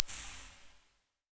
Instrument samples > Synths / Electronic
IR (Analog Device) - Late 90s Soundcraft Signature 12 - HALL N CHORUS
That device is noisy, but these are Soundcraft Signature 12 inbuilt reverbs :) Impulse source was 1smp positive impulse. Posting mainly for archival, but I will definetly use these!!